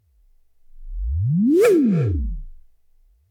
Sound effects > Electronic / Design
SCI FI WHOOSH 4 SLAPBACK
From a collection of whooshes made from either my Metal Marshmallow Pro Contact Mic, Yamaha Dx7, Arturia V Collection
air, company, effect, flyby, gaussian, jet, pass-by, sound, swoosh, transision, ui, whoosh